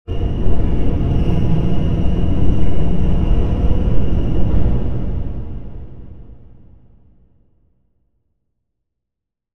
Sound effects > Experimental
mangled echo
based on 810608__cvltiv8r__analog-bass-sweeps-and-fx-123 added effects such as reverb etc.
effect, mysterious, reverb